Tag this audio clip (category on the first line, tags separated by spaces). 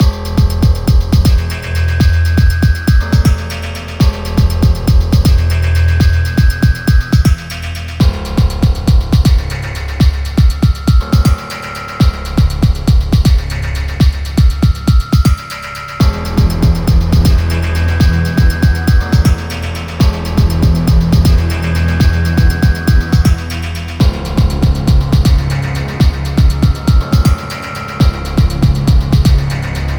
Music > Multiple instruments
120-bpm 16-bar 16-bar-120-bpm assassin-loop assassin-theme black-ops dark-beat dark-edm Dylan-Kelk edm espionage-theme espionage-vibe espiongae-music infiltraition-music infiltration-music-theme infiltratition-theme predatory-theme SilverIlusionist sneak-theme sneaky-theme stealth-assassin-music stealth-game-loop stealth-game-music stealth-gametrack stealth-mission thief-beat